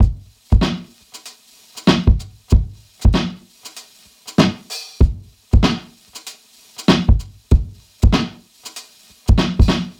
Music > Solo percussion
A short set of Acoustic Breakbeats recorded and processed on tape. All at 96BPM